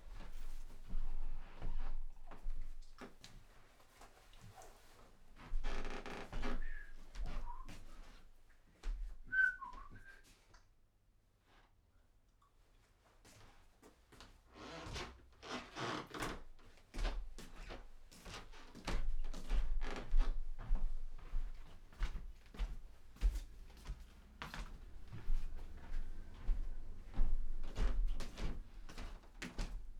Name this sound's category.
Sound effects > Human sounds and actions